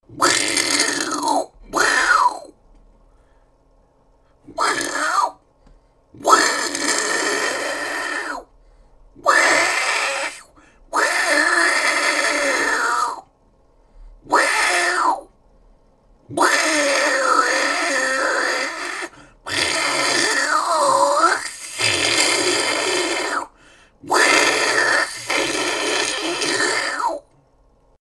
Sound effects > Animals
TOONAnml-Blue Snowball Microphone, CU Cat, Angry, Human Imitation Nicholas Judy TDC
An angry cat. Human imitation.
angry, Blue-Snowball, cartoon, cat, human, imitation